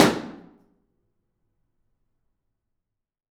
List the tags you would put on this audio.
Sound effects > Natural elements and explosions
Pop 32float Response High IR Balloon 32 Impulse Quality float Measuring Data

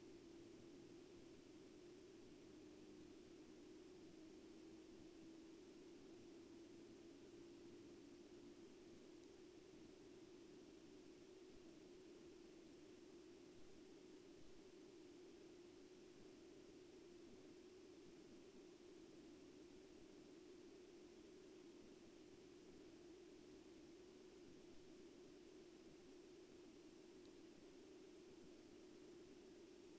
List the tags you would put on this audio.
Soundscapes > Nature
weather-data alice-holt-forest field-recording modified-soundscape nature sound-installation natural-soundscape raspberry-pi Dendrophone data-to-sound phenological-recording artistic-intervention soundscape

